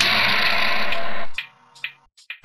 Sound effects > Electronic / Design
Impact Percs with Bass and fx-037
bash, bass, brooding, cinamatic, combination, crunch, deep, explode, explosion, foreboding, fx, hit, impact, looming, low, mulit, ominous, oneshot, perc, percussion, sfx, smash, theatrical